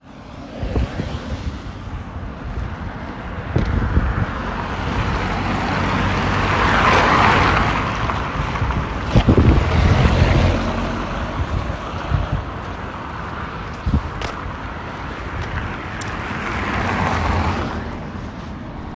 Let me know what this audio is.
Soundscapes > Urban
Car passing Recording 22
The sound originates from a passenger car in motion, generated by the engine and tire–road interaction. It consists of continuous engine noise and tire friction, with a noticeable Doppler change as the car approaches and passes the recording position. The sound was recorded on a residential street in Hervanta, Tampere, using a recorder in iPhone 12 Pro Max. The recording is intended for a university audio processing project, suitable for simple analysis of pass-by sounds and spectral changes over time.
Cars, Road, Transport